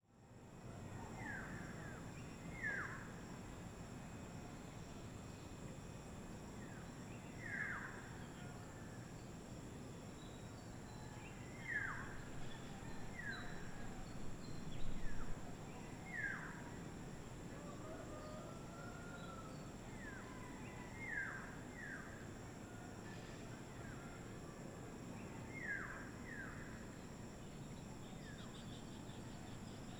Soundscapes > Urban
Dawn chorus and sunrise in a Filipino suburb. I made this recording at about 5:15AM, from the terrace of a house located at Santa Monica Heights, which is a costal residential area near Calapan city (oriental Mindoro, Philippines). One can hear the atmosphere of this place during sunrise, with some crickets, dawn chorus from local birds that I don’t know, roosters and dogs in the distance, distant traffic hum, and few vehicles passing by in the surroundings. At #11:45, one can hear cicadas starting their noise, and at #17:17, the bell from the nearby church starts ringing. Recorded in July 2025 with a Zoom H6essential (built-in XY microphones). Fade in/out applied in Audacity.